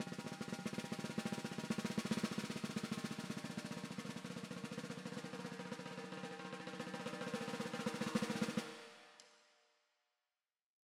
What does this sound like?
Music > Solo percussion
snare Processed - medium soft roll - 14 by 6.5 inch Brass Ludwig
fx, perc, hits, snareroll, hit, realdrum, drumkit, processed, rimshot, snaredrum, realdrums, crack, oneshot, snares, acoustic, rimshots, beat, brass, reverb, rim, sfx, drums, snare, kit, roll, percussion, ludwig, flam, drum